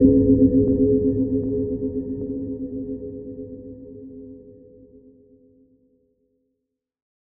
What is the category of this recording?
Sound effects > Electronic / Design